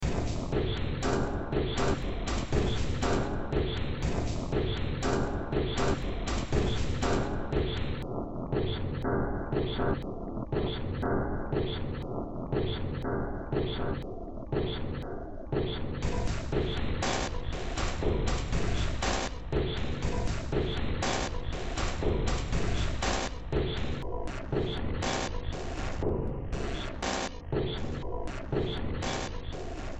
Music > Multiple instruments
Demo Track #3170 (Industraumatic)
Ambient, Cyberpunk, Games, Horror, Industrial, Noise, Sci-fi, Soundtrack, Underground